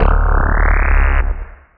Instrument samples > Synths / Electronic
CVLT BASS 47
bass, bassdrop, clear, drops, low, stabs, sub, subbass, synth, synthbass, wobble